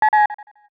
Sound effects > Other mechanisms, engines, machines
A small robot that thinking about something, connecting, bleeping. I originally designed this for some project that has now been canceled. Designed using Vital synth and Reaper